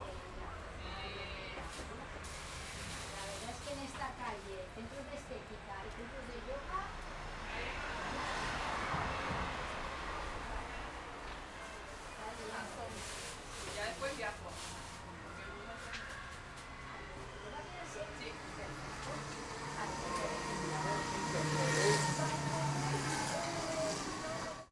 Sound effects > Human sounds and actions
MALLORCA BARRIO 07
Recorded around a mixed-use neighbourhood of Palma in the early evening. 4 lanes of traffic including busses driving by, the banging of flower market being dismantled can be heard sometimes. People talking etc. Recorded with a Zoom H6, compressed slightly
mallorca, background, foley, town